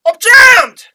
Speech > Solo speech
Soldier yelling Jammed
War, Combat